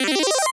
Sound effects > Electronic / Design
8-bit "pli-pli-pli-plim" ARP
8-bit arp that i created and processed in DAW; This one is "pli-pli-plim" or whatever, sounds like some power-up use. Ы.